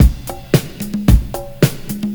Music > Solo percussion
Disco loop sample at 111 bpm